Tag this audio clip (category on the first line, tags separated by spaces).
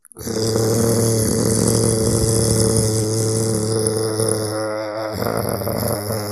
Speech > Solo speech
angry annoyed frustration grr grumpy human